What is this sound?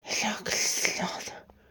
Sound effects > Human sounds and actions
helâkül silôn
Something bad happened that day
humour, zibidi